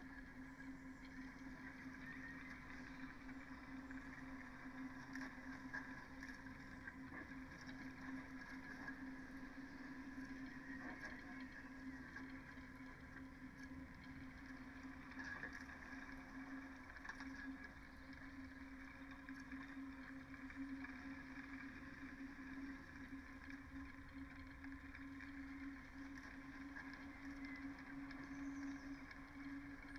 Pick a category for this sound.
Soundscapes > Nature